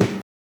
Instrument samples > Percussion
drum-000 snare
My sister's snare1 with damper rubber ring. And I've removed the reverb.